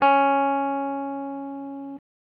Instrument samples > String
Random guitar notes 001 CIS4 01
electric, electricguitar, guitar, stratocaster